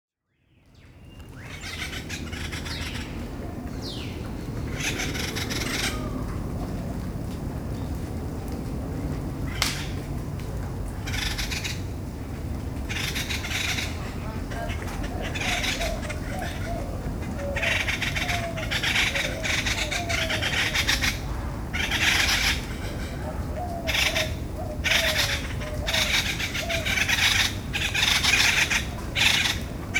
Soundscapes > Nature
Sonido aves parque El Picacho Honduras
Sound of different birds in El Picacho national park in Honduras.
ambient, birds, field-recording, honduras, park